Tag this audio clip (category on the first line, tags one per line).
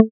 Instrument samples > Synths / Electronic
pluck
additive-synthesis
fm-synthesis